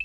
Sound effects > Other
A tiny, high-pitched little ping sound. Made by water sloshing in a glass.